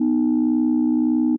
Instrument samples > Synths / Electronic
Landline Phonelike Synth B4
Holding-Tone, JI, JI-3rd, JI-Third, just-minor-3rd, just-minor-third, Landline, Landline-Holding-Tone, Landline-Phone, Landline-Phonelike-Synth, Landline-Telephone, Landline-Telephone-like-Sound, Old-School-Telephone, Synth, Tone-Plus-386c